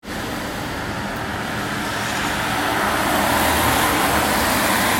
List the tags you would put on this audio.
Sound effects > Vehicles
auto
car
city
field-recording
street
traffic

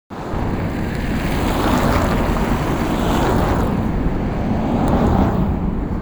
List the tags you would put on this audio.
Sound effects > Vehicles
vehicle
car
traffic

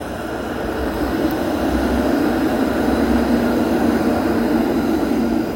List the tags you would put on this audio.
Sound effects > Vehicles
vehicle
tram

